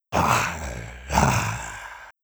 Sound effects > Other
Another sound effect of a monster/creature. Could be used for a lot of things one example could be for a enemy creature in an RPG when it is swinging at you when attacking. Combined with some swiping noises could work nicely to sell the effect. The only edit is dropping pitch by -3 for deeper voice. Besides that just trimming clip and silencing parts on either side of sound. Made by R&B Sound Bites if you ever feel like crediting me ever for any of my sounds you use. Good to use for Indie game making or movie making. Get Creative!
Growl, Creature, Monster